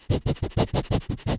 Speech > Other
sniffing frantic
Frantic sniffing. Microphone: model & type: Blue Snowball
Nose, smell, Sniffing